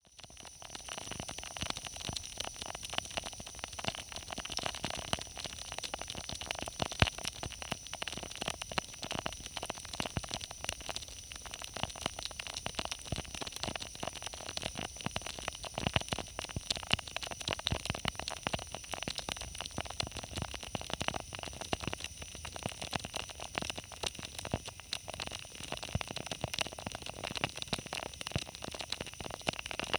Sound effects > Objects / House appliances
A hydrophone recording of water running from a tap into a bathroom sink.